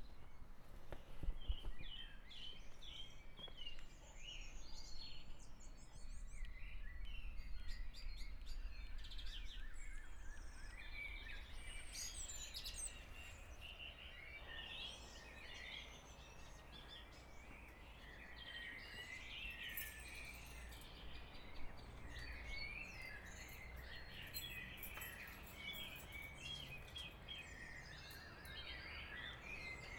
Soundscapes > Nature
English garden, early morning, spring, quiet, birds, dawn chorus
A large garden in Aylesbury, recorded at 5.08am on 30th March 2011. Peaceful, various birds.